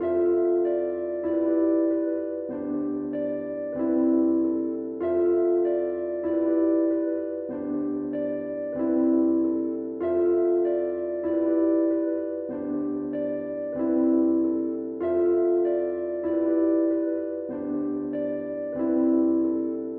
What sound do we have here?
Music > Solo instrument
A soft and mellow melody, rather sweat and cheerful with a touch of nostalgia. Made with an electric piano. This loop was created to be sampled and reused, like a songstarter. In C maj at 96bpm.